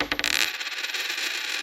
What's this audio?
Sound effects > Objects / House appliances
OBJCoin-Samsung Galaxy Smartphone Dime, Drop, Spin 10 Nicholas Judy TDC
dime, drop, foley, Phone-recording, spin